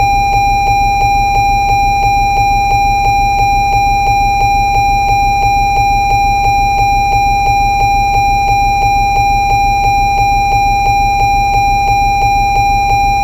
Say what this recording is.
Sound effects > Vehicles
BEEPVeh-CU Warning Chime, Fast, Toyota Highlander, Looped Nicholas Judy TDC
A fast warning chime on a Toyota Highlander.
car, chime, fast, Phone-recording, toyota-highlander, warning